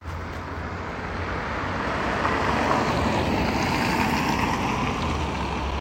Sound effects > Vehicles
Car driving by. Recorded with a phone on a cold, cloudy day.